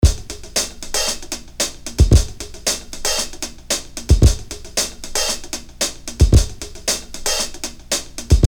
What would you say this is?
Music > Solo percussion
Ableton Live. VST......Fury-800......Drums 113 bpm Free Music Slap House Dance EDM Loop Electro Clap Drums Kick Drum Snare Bass Dance Club Psytrance Drumroll Trance Sample .
Snare, bpm, Electro, Free, Clap, Music, EDM, Bass, Loop, Slap, House, Drum, 113, Dance, Kick, Drums